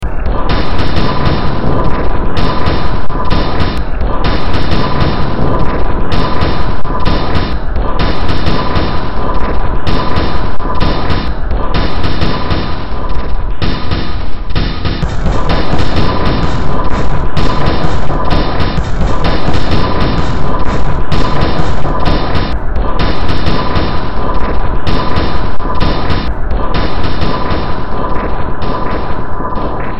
Music > Multiple instruments
Demo Track #3966 (Industraumatic)
Ambient, Industrial, Noise, Sci-fi, Underground